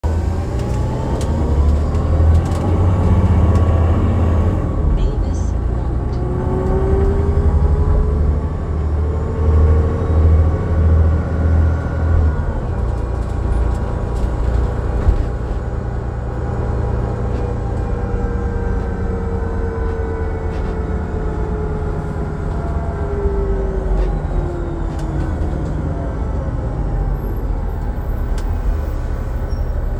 Sound effects > Vehicles
2007 New Flyer D40LFR Transit Bus (Voith transmission) (MiWay 0735)
I recorded the engine and transmission sounds when riding the Mississauga Transit/MiWay buses. This is a recording of a 2007 New Flyer D40LFR transit bus, equipped with a Cummins ISL I6 diesel engine and Voith D864.5 4-speed automatic transmission. This bus was retired from service in 2025. This specific bus has an odd-sounding transmission that produces a lower pitched noise when in 3rd gear, similar to the transmissions on Mosgortrans' LiAZ-5292 buses in Moscow. Mosgortrans bus 07343 sounds fairly similar to this clip of MiWay 0735.
bus, cummins, d40, d40lf, d40lfr, driving, engine, flyer, isl, mississauga, miway, new, public, ride, transit, transmission, transportation, truck, voith